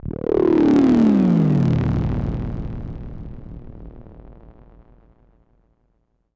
Sound effects > Experimental
Analog Bass, Sweeps, and FX-092
dark, weird, pad, sci-fi, korg, synth, robotic, oneshot, robot, mechanical